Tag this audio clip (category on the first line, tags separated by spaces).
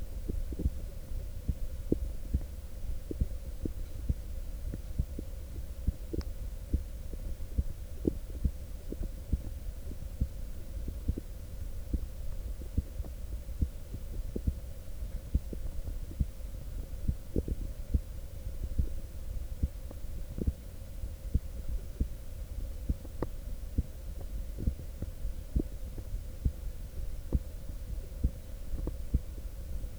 Sound effects > Human sounds and actions
anatomy beat blood body heart heart-beat heartbeat horror medical pulse pumping stethoscope thump